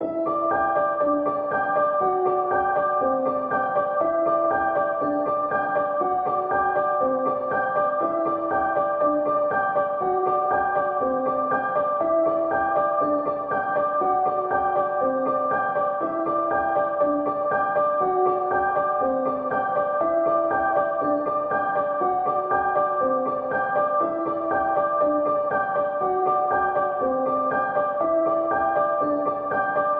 Music > Solo instrument
Piano loops 102 efect 4 octave long loop 120 bpm
Beautiful piano harmonies. VST/instruments used . This sound can be combined with other sounds in the pack. Otherwise, it is well usable up to 4/4 120 bpm.
120bpm, 120, simplesamples, samples, piano, loop, free, simple, reverb, music, pianomusic